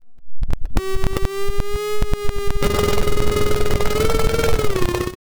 Sound effects > Electronic / Design
Optical Theremin 6 Osc Destroyed-022
Analog, Glitch, DIY, Alien, noisey, Experimental, Spacey, Scifi, Handmadeelectronic, Infiltrator, Robotic, Theremin, SFX, Sci-fi, Robot, Noise, Trippy, Dub, Otherworldly, Theremins, Synth, FX, Electro, Glitchy, Instrument, Electronic, Digital, Bass, Sweep, Optical